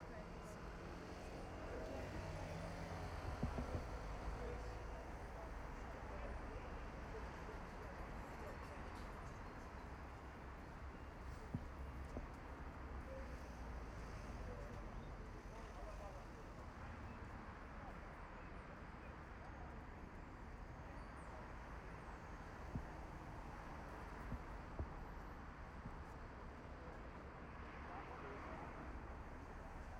Soundscapes > Urban
Busy Street, Day, Buses, Cars 2, 2OA - Spatial Audio
Busy street during the day with a lot of traffic, Wood Green, London. Recorded with Reynolds 2nd Order Ambisonics microphone, the audio file has 9 tracks, already encoded into B-Format Ambisonics. Can be encoded into binaural format.
2OA, Ambience, Ambisonics, AmbiX, Binaural, Bus, Busy, Cars, City, City-Ambience, Day, Free, Immersive, Immersive-Audio, Multichannel, O2A, Road, Spatial, Spatial-Audio, Street, Traffic, Transport, Travel, Urban, Walking